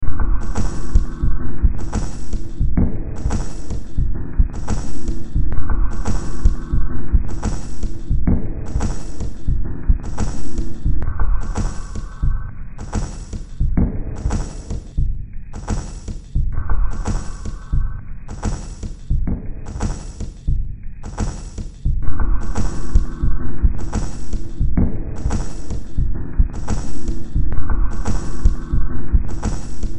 Music > Multiple instruments
Demo Track #3911 (Industraumatic)
Cyberpunk, Games, Industrial, Noise, Sci-fi, Soundtrack, Underground